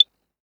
Sound effects > Objects / House appliances
Masonjar Fill 3 Drop
Filling a 500ml glass mason jar with water, recorded with an AKG C414 XLII microphone.
mason-jar, drop, water